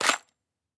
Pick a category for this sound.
Sound effects > Other mechanisms, engines, machines